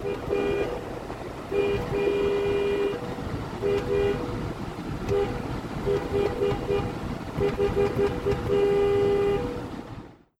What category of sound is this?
Sound effects > Vehicles